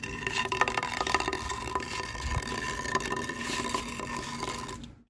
Sound effects > Objects / House appliances
WOODFric-Samsung Galaxy Smartphone, CU Board Scrape On Concrete Nicholas Judy TDC
A wooden board scraping on concrete.
wooden, foley